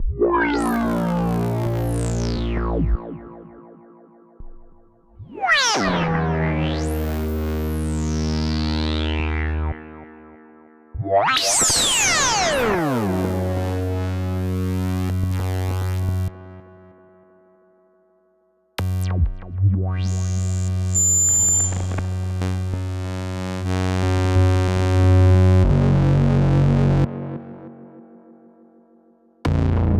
Sound effects > Experimental
Analog Bass, Sweeps, and FX-026
alien, analog, analogue, bass, basses, bassy, complex, dark, effect, electro, electronic, fx, korg, machine, mechanical, oneshot, pad, retro, robot, robotic, sample, sci-fi, scifi, sfx, snythesizer, sweep, synth, trippy, vintage, weird